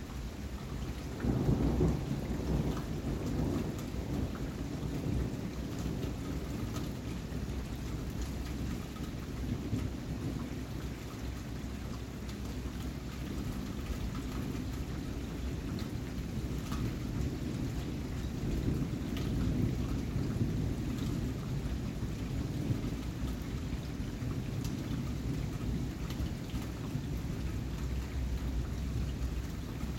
Soundscapes > Nature
STORM-Samsung Galaxy Smartphone, CU Heavy Rain, Loud Thunder Rumbles, Overlapped Nicholas Judy TDC
Heavy rain overlapping loud thunder rumbles.
loud, heavy, Phone-recording, rain, rumble, thunder, overlap